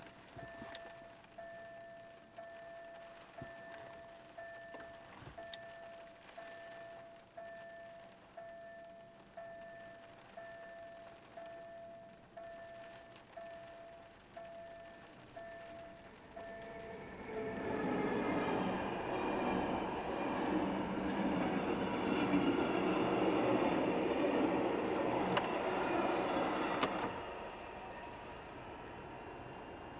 Soundscapes > Indoors
Car Ride 2
Old recording, made probably with phone, during one of many 2015's car rides. This ride took place on a rainy evening and started at a railroad crossing.
car, engine, railroad, rain, ride, train